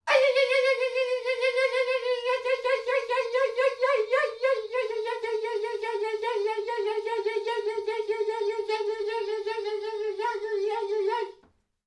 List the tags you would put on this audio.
Sound effects > Human sounds and actions
Alien alternate applause FR-AV2 indoor single solo-crowd weird XY

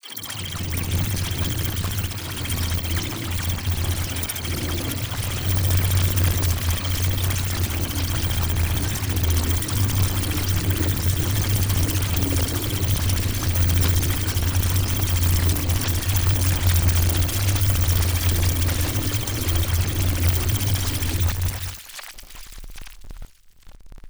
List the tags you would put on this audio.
Sound effects > Electronic / Design
Fluid Glitch Texture

